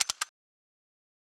Sound effects > Other mechanisms, engines, machines
Ratchet strap-1
Ratchet strap cranking
clicking, machine, ratchet, mechanical, machinery, crank, strap